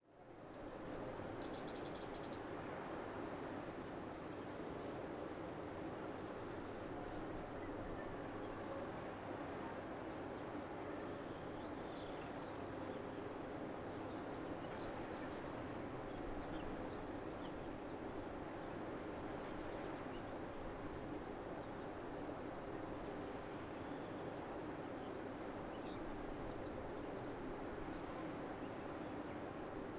Soundscapes > Nature
Seashore atmos 1
Seashore atmosphere, day, exterior recorded in the Therma bay, Ikaria, Greece. Some birds can be heard.
shore, beach, sea, field-recording, faraway, bay, atmos, birds, waves, seashore, ocean